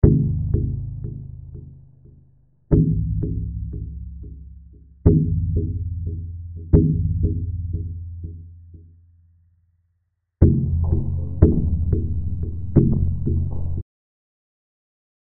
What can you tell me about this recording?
Sound effects > Electronic / Design
Detuned cyclic plucks
Resampled plucked synth sound from my own synth loop
synth, ambience, atmosphere